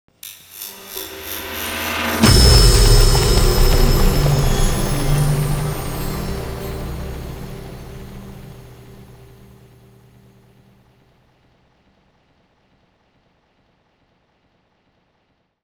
Sound effects > Electronic / Design
A heavily processed magical spell burst sound effect created in FL Studio using a plethora of vsts including Phase Plant, Freak, Fab Filter, Rift, Valhalla, Raum, Fragments Luxverb, Graindad, and others. Use for videogames, thater, movie trailer design, EDM or anything else your heat sees fit. Enjoy~